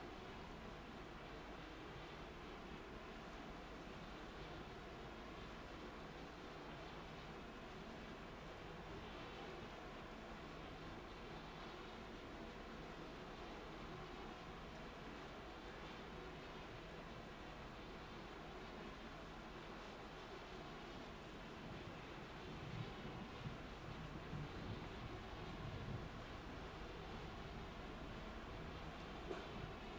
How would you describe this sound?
Soundscapes > Urban
The distant, monotonous humming of wind turbines on a cold day, near Vitteaux. Far away a tractor. 2 x EM272 mics